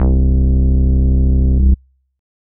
Instrument samples > Synths / Electronic
VSTi Elektrostudio (Model Mini+Micromoon)
bass, vst, synth, vsti